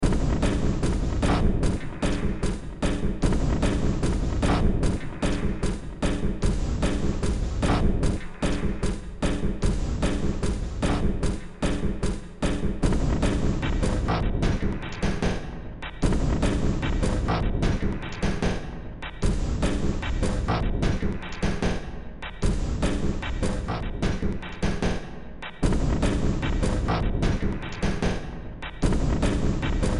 Music > Multiple instruments
Short Track #3542 (Industraumatic)
Sci-fi, Noise, Cyberpunk